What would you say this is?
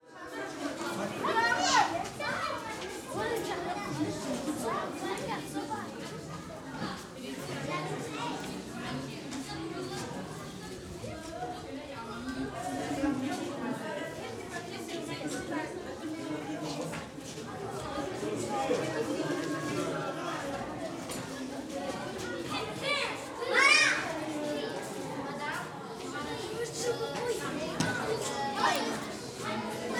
Soundscapes > Other
children at school in Tunisia coutriside
Children voices in a semi reverberated accoustic. Morning time, before the lesson. Arabic and some french words, girls and boys Children between 5 and 12 and some adult voices. Foot steps on dusty concret floor.
children, school, playground, courtyard, class, field-recording, kindergarten, countryside, Tunisie, kids, El-Kef, Tunisia, school-yard